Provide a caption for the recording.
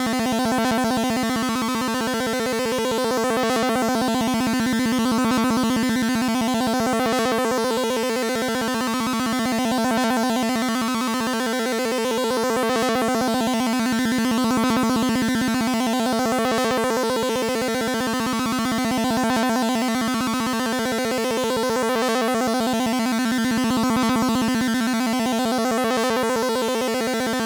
Sound effects > Electronic / Design
Clip sound loops 2
Just easily FM a saw wave with a square wave. Synthsiser just phaseplant.
8-bit, clip, fx, game